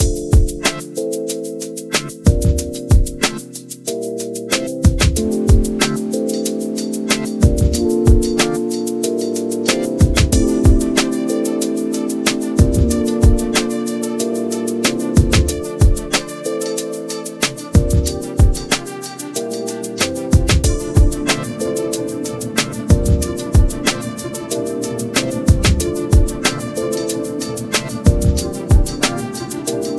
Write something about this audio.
Music > Multiple instruments
This is a chill song that I made on bandlab
chill, nebula, song